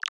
Instrument samples > Percussion
Organic-Water Snap 4
Glitch, Organic, EDM, Botanical, Snap